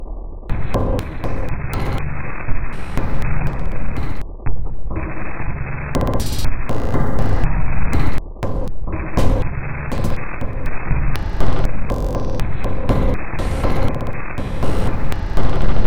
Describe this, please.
Instrument samples > Percussion
This 121bpm Drum Loop is good for composing Industrial/Electronic/Ambient songs or using as soundtrack to a sci-fi/suspense/horror indie game or short film.
Ambient, Soundtrack, Drum, Industrial, Alien, Loopable, Weird, Packs, Underground, Dark, Samples, Loop